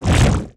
Sound effects > Electronic / Design
Cake or shit on your face-3!
Hi ! If you used it in your games ,movies , Videos , you should tell me , because it's really cool!!! I just used a wavetable of Crystal and used ENV to give its WT Position some move. And Used a sine wave to modulate it Distortion is the final process Enjoy your sound designing day!
shit, Cartoon, Rumble, Synthtic, Game, cake, Effect, Movie, FX, OneShot